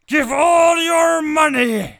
Speech > Solo speech
give all your money
male, voice, drunk, money, man